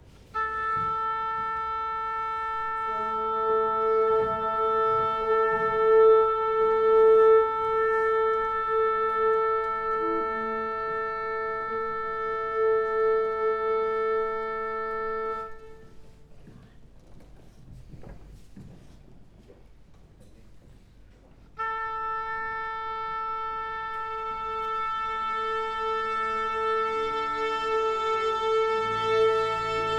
Music > Multiple instruments
orchestra tuning 1 / orquesta afina 1
orquesta sinfonica afinando en el foso. grabado en el teatro del libertador san martin, con par stereo rode nt5 y sound devices 664 ------------------------------------------------------------------------------------------------------------------- Symphony orchestra tuning in the pit. Recorded at the Libertador San Martín Theater, with a Rode NT5 stereo pair and Sound Devices 664.
Symphony-Orchestra, teatro, perfom, oquesta, 440, Symphony, tuning, theater, music, la, cordoba, afina, orchestra